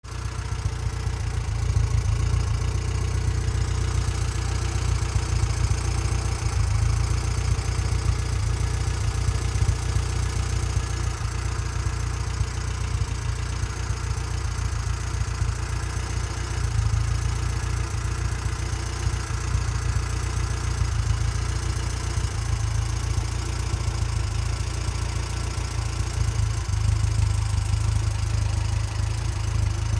Sound effects > Vehicles
Fiat 600 MOTOR BACK
Back POV of a engine from a Fiat 600 Recorded with: Sound Devices Mix-Pre 6-II, Sennheiser MKH 416.
automobile, car, engine, motor, vehicle